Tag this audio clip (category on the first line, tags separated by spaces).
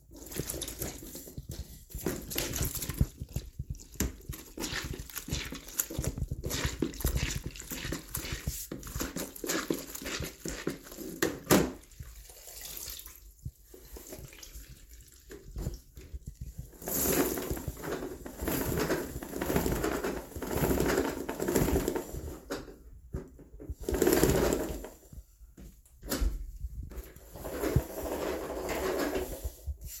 Sound effects > Objects / House appliances
wet wring Phone-recording drench foley mop spin bucket